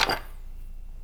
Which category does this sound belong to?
Sound effects > Other mechanisms, engines, machines